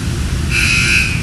Sound effects > Other mechanisms, engines, machines
EXT. - CAR WASH Drive through car wash alarm buzzes to indicate entrance Recorded with iPhone 13.